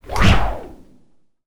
Sound effects > Electronic / Design
Whoosh - Mosquito racked Mixed
Subject : Mixed 4 whooshes from an electric mosquito racket. Date YMD : 2025 July 03 Location : Albi 81000 Tarn Occitanie France. Sennheiser MKE600 with stock windcover P48, no filter. Weather : Processing : Trimmed fades in / out changes in speed in Audacity.
processed, Sennheiser, whooosh, oneshot, FR-AV2, swing, Electric-racket, Shotgun-microphone, SFX, MKE-600, edited, Stereo, swinging, airy, wooosh, woosh, MKE600, Mixed, Tascam, Hypercardioid, one-shot, whoosh, Shotgun-mic